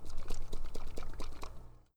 Sound effects > Objects / House appliances
TOYMisc-Blue Snowball Microphone Magic 8 Ball, Shake 04 Nicholas Judy TDC
Blue-Snowball, shake, Blue-brand, magic-8-ball, foley